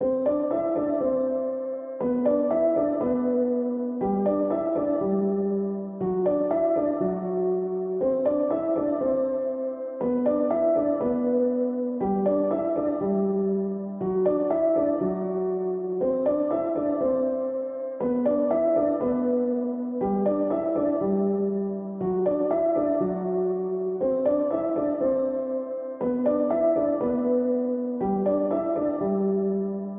Music > Solo instrument
Piano loops 109 efect 4 octave long loop 120 bpm
120bpm, samples, free, reverb, piano, simplesamples, pianomusic, 120, simple, loop, music